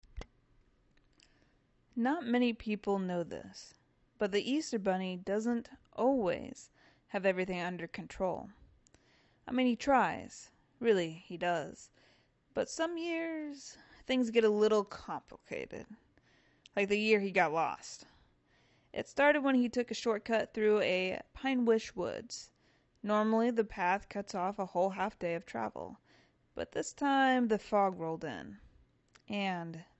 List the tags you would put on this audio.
Speech > Solo speech
wholesomeadventure ministory audiobookscript script easterstory

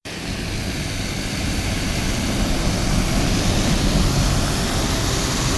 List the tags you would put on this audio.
Sound effects > Vehicles
transportation vehicle bus

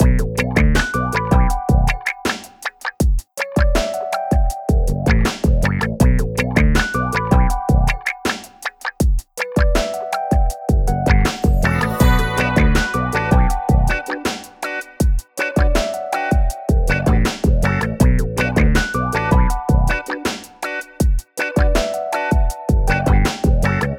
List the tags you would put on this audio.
Music > Multiple instruments
bow-chicka-a-wow-wow comedic-sexy funny-sexy porn-guitar porno-groove seduction seductive-groove seductive-music seductive-theme sensual sex-comedy sexy sexy-bass sexy-funk sexy-groove sexy-guitar sexy-joke suggestive

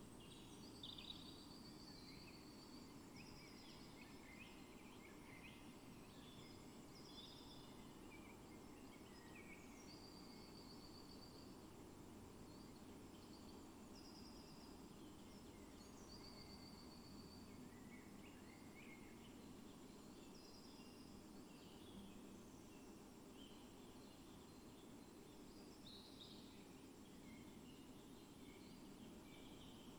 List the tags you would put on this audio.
Soundscapes > Nature
alice-holt-forest artistic-intervention field-recording raspberry-pi weather-data sound-installation Dendrophone nature modified-soundscape soundscape

